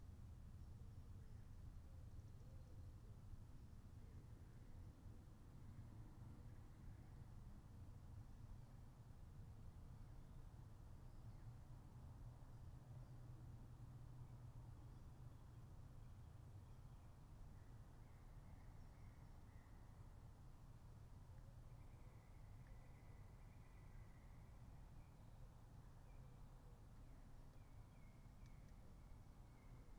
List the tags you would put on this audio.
Soundscapes > Nature
nature
modified-soundscape
soundscape
natural-soundscape
field-recording
artistic-intervention
raspberry-pi
alice-holt-forest
weather-data
sound-installation
phenological-recording
Dendrophone
data-to-sound

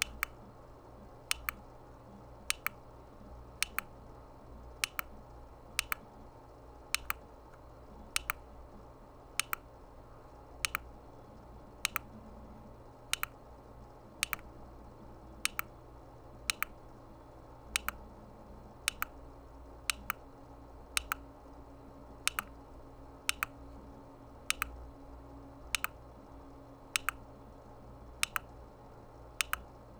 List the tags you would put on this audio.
Sound effects > Objects / House appliances
click
Blue-Snowball
m187
foley
Blue-brand

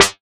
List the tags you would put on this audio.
Instrument samples > Synths / Electronic
bass
additive-synthesis
fm-synthesis

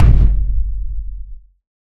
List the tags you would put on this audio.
Instrument samples > Percussion
ashiko
bata
bongo
bougarabou
djembe
drum
dundun
DW
floor
floortom
kettledrum
Ludwig
ngoma
Pearl
percussion
Premier
Sonor
tabla
taboret
talkdrum
talking-drum
talktom
Tama
tambour
tam-tam
tenor-drum
timpano
tom
tom-tom
Yamaha

